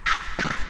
Sound effects > Other
25 - Strong Dark Spells Foleyed with a H6 Zoom Recorder, edited in ProTools